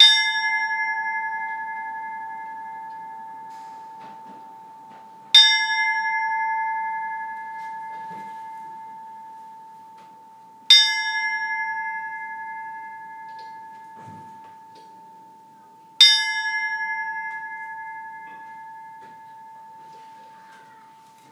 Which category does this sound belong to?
Instrument samples > Percussion